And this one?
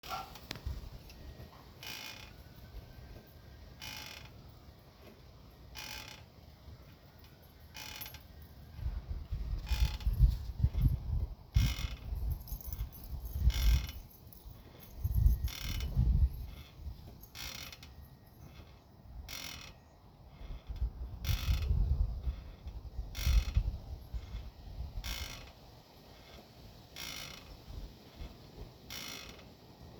Sound effects > Objects / House appliances
Squeaky rocking chair
The sound is of a rocking chair out on the back patio.
chair, repetitive, rocking, sitting, squeak, wooden